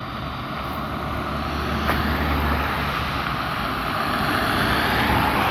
Soundscapes > Urban
cars driving past in rain